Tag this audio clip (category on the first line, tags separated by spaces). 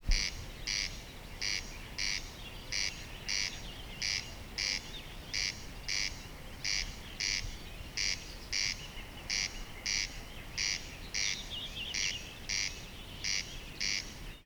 Soundscapes > Nature
ambience ambient birds corncrake field-recording nature soundscape